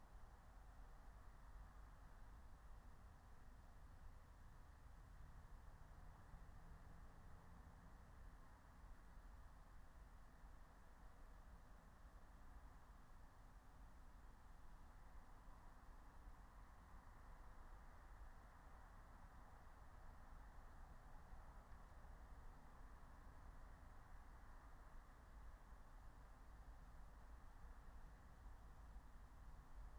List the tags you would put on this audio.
Soundscapes > Nature
phenological-recording raspberry-pi natural-soundscape nature field-recording soundscape meadow alice-holt-forest

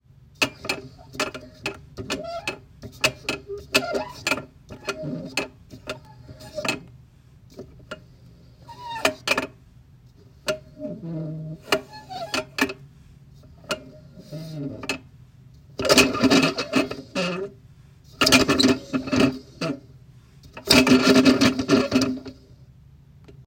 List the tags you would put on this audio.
Sound effects > Objects / House appliances
globe metal revolve revolving spin world

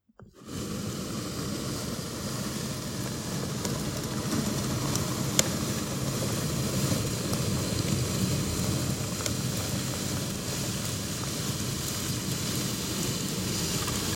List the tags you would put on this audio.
Sound effects > Objects / House appliances
scraping friction box paper grinding scrape pull drag foley cardboard brushing scratch egg scratching grind push

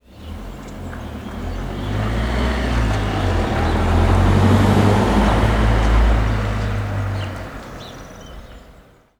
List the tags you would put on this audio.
Sound effects > Vehicles
2025 Village Zoom H5 Spring April Gergueil XY Ambience Rural Outdoor car

